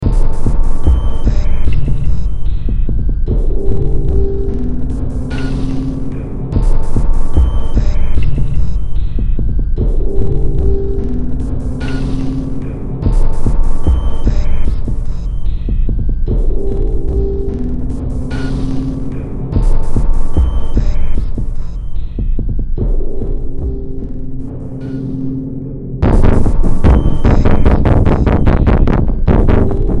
Music > Multiple instruments
Demo Track #3291 (Industraumatic)
Ambient, Cyberpunk, Games, Horror, Industrial, Noise, Sci-fi, Soundtrack, Underground